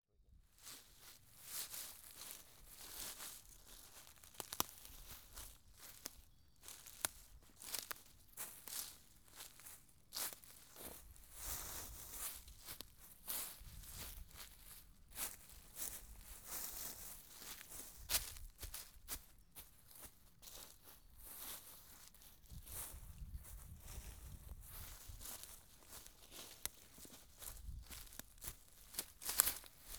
Sound effects > Human sounds and actions
Footsteps recorded outdoors with boots on. Walking on grass and leaves.
field, recording, boots, outdoors, footsteps, leaves